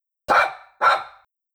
Sound effects > Animals
A sound effect of a Shih Tzu barking. It only has been edited to remove background noise of either side of clip and also volume was amplified by 5db overall due to a quiet recording. Made by R&B Sound Bites if you ever feel like crediting me ever for any of my sounds you use. Good to use for Indie game making or movie making. Get Creative!